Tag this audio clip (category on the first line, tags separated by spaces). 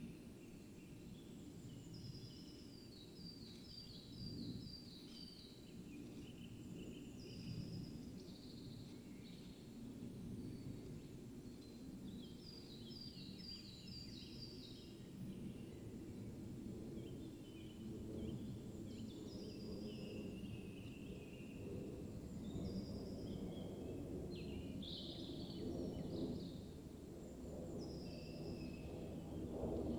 Soundscapes > Nature
data-to-sound artistic-intervention natural-soundscape raspberry-pi Dendrophone phenological-recording nature modified-soundscape sound-installation field-recording alice-holt-forest weather-data soundscape